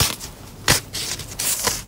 Sound effects > Objects / House appliances
Sew Stitch Fix
cloth, clothing, fabrich, Fix, fixed, Fixer, Fixing, game, hand-sewing, handsewing, item, needle, pin, pins, pop, prick, puncture, Quick, seamster, seamstress, Sew, Sewer, Sewing, Sewn, Stitch, Stitched, Stitcher, Stitching, textile, thread